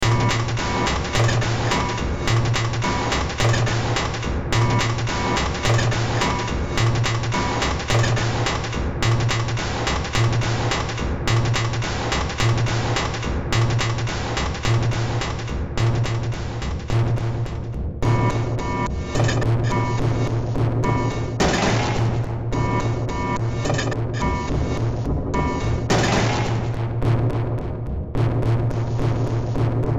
Music > Multiple instruments
Demo Track #3646 (Industraumatic)
Horror, Games, Industrial, Underground, Noise, Sci-fi, Ambient, Cyberpunk, Soundtrack